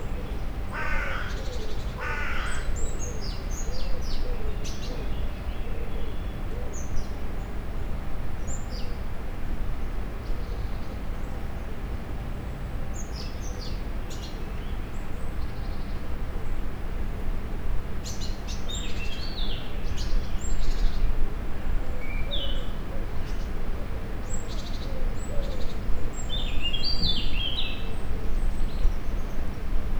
Soundscapes > Urban
250725 09h26 Albi Jardins Du Palais - Facing West OKM1
Subject : Albi Jardins Du Palais West side facing west. Date YMD : 2025 July 25 Location : Albi 81000 Tarn Occitanie France. Soundman OKM1 Binaural in ear microphones. Weather : Light grey sky (with small pockets of light). A few breezes About 16°c Processing : Trimmed and normalised in Audacity.